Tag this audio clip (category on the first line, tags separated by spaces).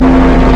Instrument samples > Synths / Electronic
noise electronic synth stabe